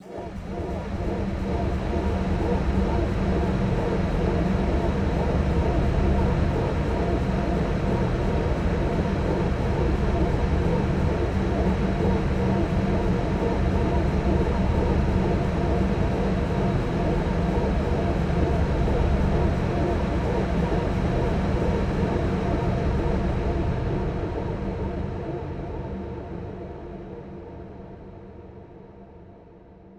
Music > Solo percussion
Simple Bass Drum and Snare Pattern with Weirdness Added 019
Bass-and-Snare, Experimental-Production, Four-Over-Four-Pattern, Fun, FX-Drum-Pattern, FX-Drums, FX-Laden, FX-Laden-Simple-Drum-Pattern, Glitchy, Interesting-Results, Silly, Simple-Drum-Pattern